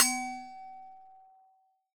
Sound effects > Objects / House appliances
Resonant coffee thermos-010
percusive, sampling, recording